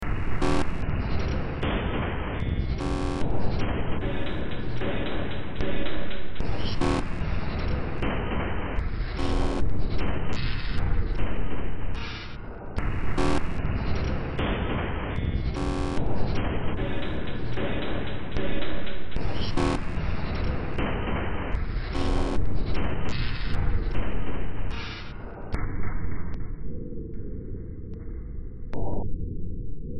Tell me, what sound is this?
Music > Multiple instruments
Demo Track #2997 (Industraumatic)
Ambient, Cyberpunk, Games, Horror, Industrial, Noise, Sci-fi, Soundtrack, Underground